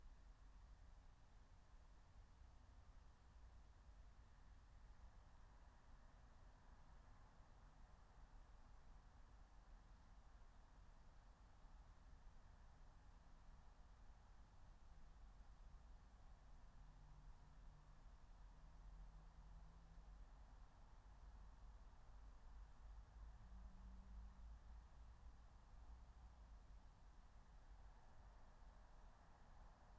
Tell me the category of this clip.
Soundscapes > Nature